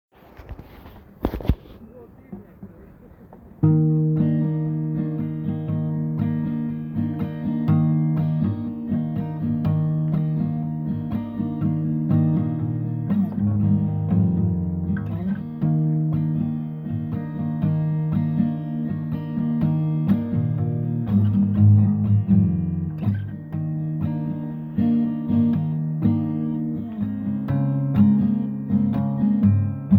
Music > Solo instrument
Who is it Now ? INDIE WATER MUSIC
Found this little guy playing music at the water how cute!!!!! I love you all I know you can feel it. I know you ca . I know it. ~~~~ I saw him with a shine in his eye, when he played this. Nothing could be healthier. It is a yamaha fg720s i believe. He told me not to record him, but I did anyway.. this was recorded July 27 in the heat of thee Sumner. Recorded on phone speakers. Recorded about 2Pm
Cinematic, Bgm, Homeless, Film, Free, Dark, Recording, Fx